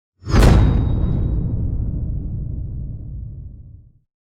Sound effects > Other
Sound Design Elements Impact SFX PS 039
thudbang, percussive, smash, impact, heavy, transient, game, power, explosion, sharp, sound, rumble, blunt, collision, design, force, effects, audio, sfx, strike, shockwave, cinematic, hard, hit, crash